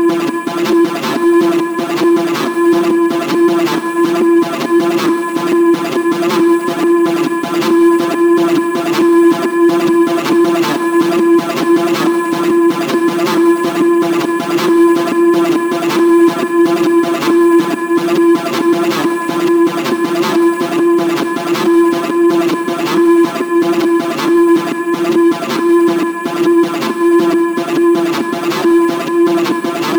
Soundscapes > Synthetic / Artificial
Space Drone 007
Drone sound 007 Developed using Digitakt 2 and FM synthesis
drone, FM, soundscape